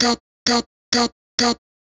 Speech > Solo speech
BrazilFunk Vocal Chop One-shot 3 130bpm
BrazilFunk, One-shot, Vocal, FX